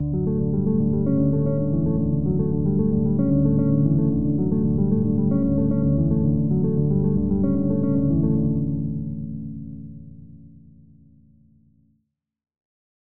Instrument samples > Other
appregiate stem from my track I've Made a Mistake , 113 BPM made using multiple vst's in fl studio